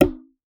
Sound effects > Objects / House appliances
Ribbon Trigger 4 Tone
Playing a stretched satin ribbon like a string, recorded with a AKG C414 XLII microphone.
satin-ribbon, ribbon, tone